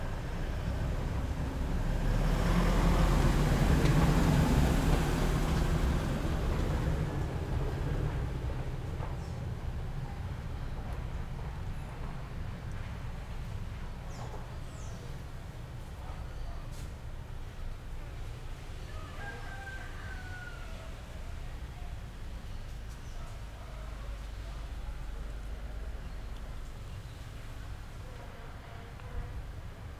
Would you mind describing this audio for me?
Soundscapes > Urban
Ambiência. Cidade, próximo ao Mirante, português, carros e motos, pessoas passando perto e conversando, pássaros, porta e alarme de carro, latidos de cachorro. Gravado no Porto do Padre, Novo Airão, Amazonas, Amazônia, Brasil. Gravação parte da Sonoteca Uirapuru. Em stereo, gravado com Zoom H6. // Sonoteca Uirapuru Ao utilizar o arquivo, fazer referência à Sonoteca Uirapuru Autora: Beatriz Filizola Ano: 2025 Apoio: UFF, CNPq. -- Ambience. Town, portuguese, car alarm and door being shut, motorcycles, people pass by, dog bark, close dialogue. Recorded at Porto do Padre, Novo Airão, Amazonas, Amazônia, Brazil. This recording is part of Sonoteca Uirapuru. Stereo, recorded with the Zoom H6. // Sonoteca Uirapuru When using this file, make sure to reference Sonoteca Uirapuru Author: Beatriz Filizola Year: 2025 This project is supported by UFF and CNPq.